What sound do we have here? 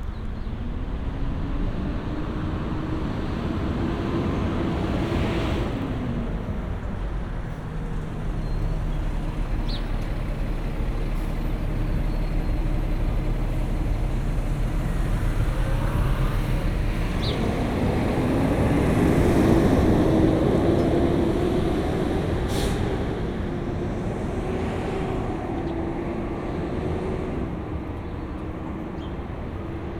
Soundscapes > Urban
AMBSubn-Spring Downtown Madisonville Midday Binaural Sidewalk Walking with traffic and Pedestrians QCF Cincinnati Roland CS-10EM
Cute Little Downtown square in small town with passing traffic, spring afternoon